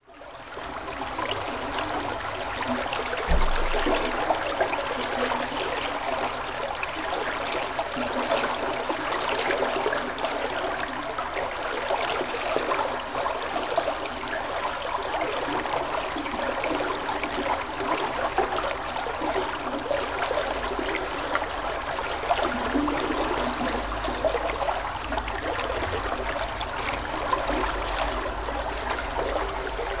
Soundscapes > Nature
A recording of water passing through a small tunnel.